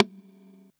Instrument samples > Percussion
Hyperrealism V9 Tom high
toms, drums, drum, tom, stereo, machine, sample, digital, one-shot